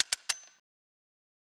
Sound effects > Other mechanisms, engines, machines
Ratchet strap-8
clicking, crank, machine, machinery, mechanical, ratchet, strap